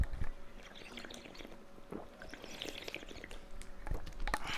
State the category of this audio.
Sound effects > Human sounds and actions